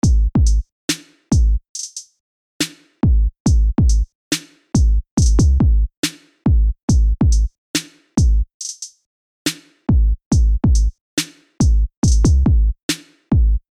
Music > Solo instrument
Simple drums trap 130 Bpm
Ableton Live. VST......Fury-800......Simple drums trap 140 Bpm Free Music Slap House Dance EDM Loop Electro Clap Drums Kick Drum Snare Bass Dance Club Psytrance Drumroll Trance Sample .